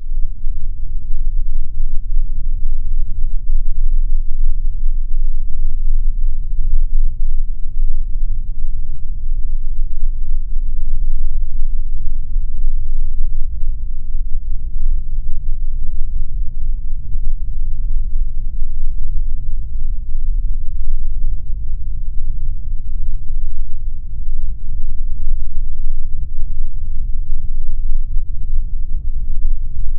Sound effects > Other mechanisms, engines, machines
Space Flight 7
The engines churned deep beneath me. For which I was grateful.
constant, humming, engines, thick